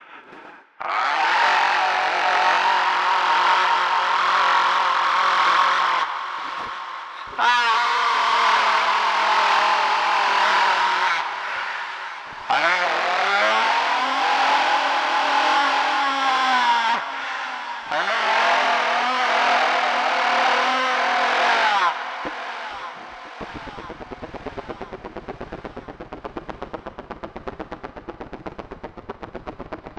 Speech > Other
Man child screaming. Sound is a recording of vocals in my home studio.